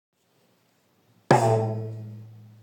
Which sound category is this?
Instrument samples > Percussion